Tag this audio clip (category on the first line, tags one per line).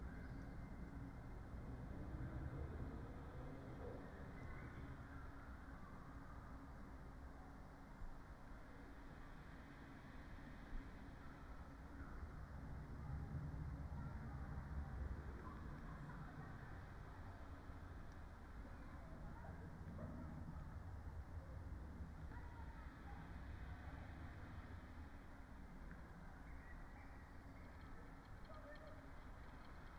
Soundscapes > Nature
data-to-sound
nature
alice-holt-forest
sound-installation